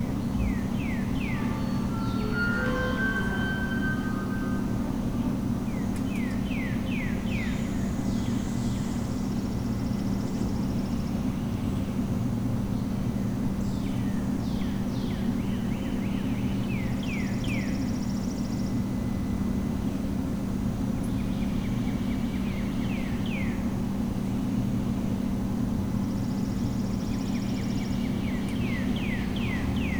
Soundscapes > Urban
AMBSubn-Summer Morning Birdsong in Neighborhood Cul de sac, Nearby pool pump, AC hum, train noise QCF Trussville Alabama Zoom H1n
Early summer morning in a wooded neighborhood in Central Alabama. AC Hum, Pool Pump, automated pool cleaner, distant train yard noise.
summer, Train